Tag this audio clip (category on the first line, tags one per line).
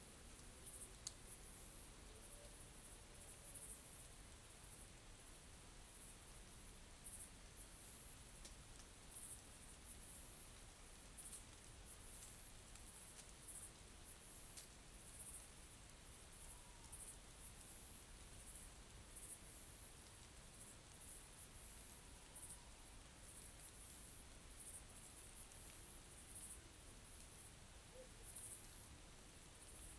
Soundscapes > Nature
AV2
dji